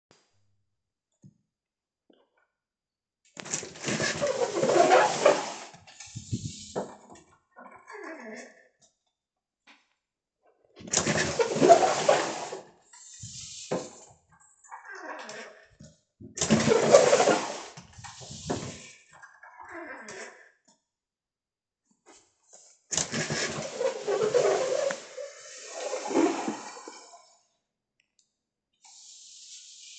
Sound effects > Objects / House appliances
sunroom door open and close at different speeds
close, door, notabanana, open